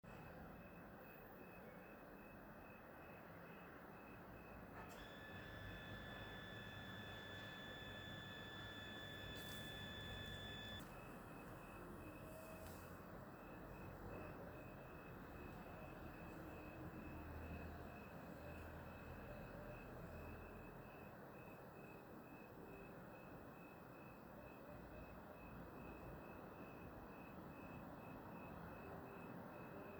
Soundscapes > Urban
Nightsounds NKC
A very short soundscape recorded on a Samsung Galaxy of the sounds at night in an African city. Prominent birdsong, a water pump whirring and passing traffic.